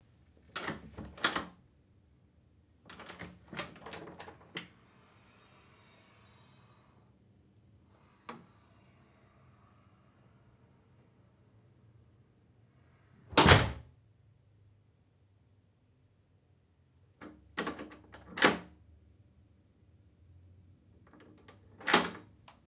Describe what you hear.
Sound effects > Objects / House appliances
loud hotel door
close, closing, field-recording, opening, hotel, loud, door